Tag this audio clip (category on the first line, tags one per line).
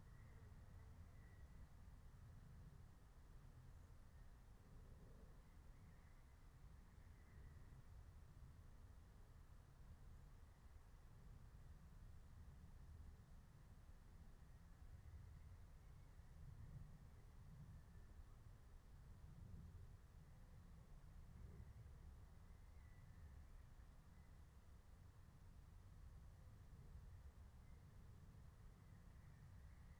Soundscapes > Nature
phenological-recording
nature
alice-holt-forest
field-recording
raspberry-pi
soundscape
natural-soundscape
meadow